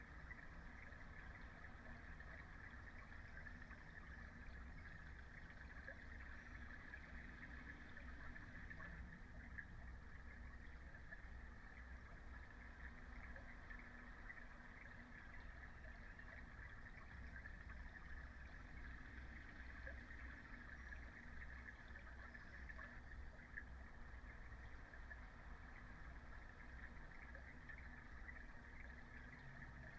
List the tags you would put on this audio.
Soundscapes > Nature
natural-soundscape
weather-data
alice-holt-forest
soundscape
field-recording
modified-soundscape
raspberry-pi

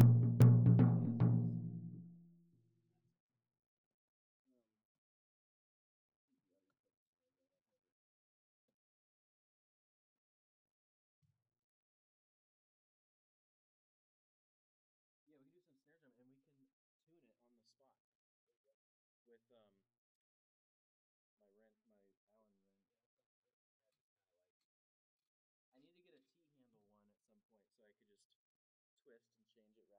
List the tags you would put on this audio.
Music > Solo percussion
acoustic
beat
drum
drumkit
drums
flam
kit
loop
maple
Medium-Tom
med-tom
oneshot
perc
percussion
quality
real
realdrum
recording
roll
Tom
tomdrum
toms
wood

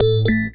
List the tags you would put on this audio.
Sound effects > Electronic / Design
UI
alert
button
options
digital
notifications
interface
menu

